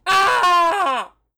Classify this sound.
Speech > Solo speech